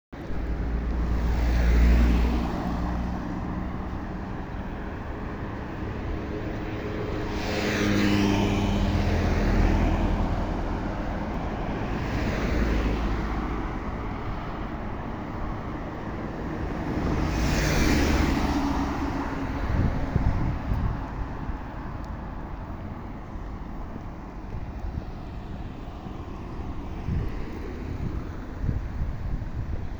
Soundscapes > Urban
20250513 0920 cars phone microphone
atmophere, field, recording